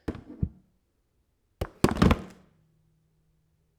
Sound effects > Objects / House appliances
250726 - Vacuum cleaner - Philips PowerPro 7000 series - inserting dust bucket
cleaner, Powerpro-7000-series, Tascam